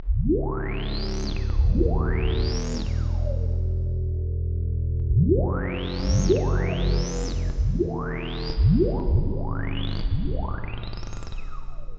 Soundscapes > Synthetic / Artificial
PPG Wave 2.2 Boiling and Whistling Sci-Fi Pads 3
dark-design, scifi, vst